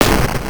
Sound effects > Natural elements and explosions
retro explosion
made in openmpt. originally used as a snare drum in my song "cubical stadium" but isolated it sounds a LOT like an explosion. USE WITH CREDIT LIKE EVERYTHING ELSE
bang, noise, idk, retro, explosion, artillery, boom, kaboom, synth, bomb, tnt, crunchy, explosive, detonation